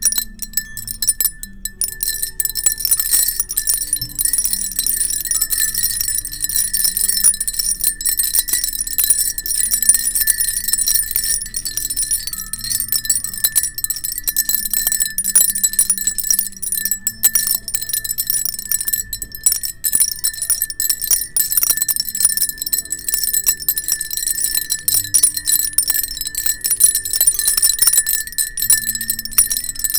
Music > Solo percussion
MUSCChim-Tascam DR05, CU Wind Chimes, Ceramic, Ringing Nicholas Judy TDC
Ceramic wind chimes tinkling. Recorded at Hobby Lobby.
ceramic, Tascam-DR05, tinkle, wind-chimes